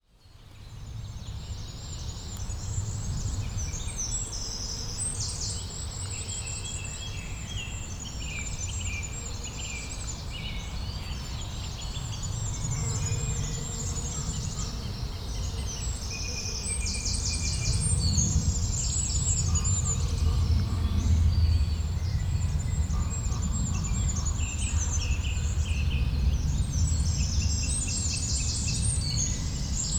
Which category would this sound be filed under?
Soundscapes > Nature